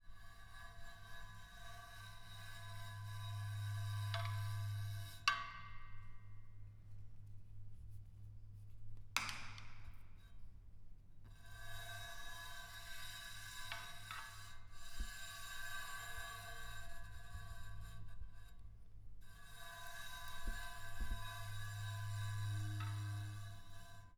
Sound effects > Other
Bowing metal newspaper holder with cello bow 7
Bowing the newspaper holder outside our apartment door. It's very resonant and creepy.